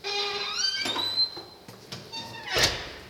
Sound effects > Objects / House appliances
Metal door being closed. Recorded with my phone.
closing, creak, door, metal, shut